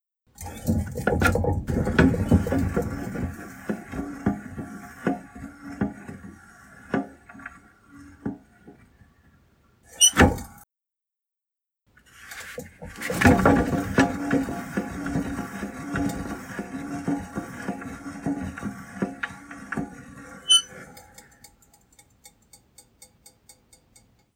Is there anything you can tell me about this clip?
Sound effects > Objects / House appliances
An old planisphere spinning and emitting metallic sounds. * No background noise. * No reverb nor echo. * Clean sound, close range. Recorded with Iphone or Thomann micro t.bone SC 420.